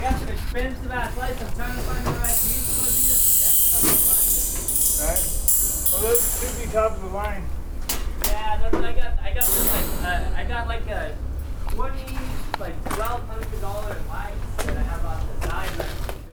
Sound effects > Objects / House appliances
Junkyard Foley and FX Percs (Metal, Clanks, Scrapes, Bangs, Scrap, and Machines) 190
Metallic, Atmosphere, dumping, Foley, tube, Bash, Junk, Junkyard, Clank, Robotic, waste, SFX, rubbish, trash, Clang, Smash, Metal, FX, garbage, Ambience, Percussion, Robot, Machine, Dump, rattle, Bang, Environment, scrape, Perc, dumpster